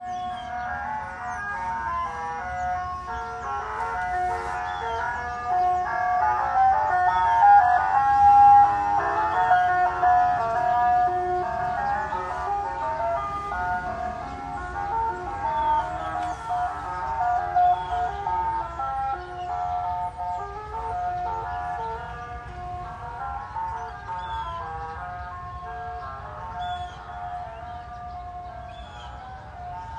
Sound effects > Vehicles
Ice Cream Truck
Music playing from an ice-cream truck in a midtown residential neighborhood. The recording of the music playing through an oversaturated speaker atop the truck. Slightly out of tune, trashy, scratchy and semi wretched-sounding; and with a lovely doppler effect as the truck passes by. Perfection!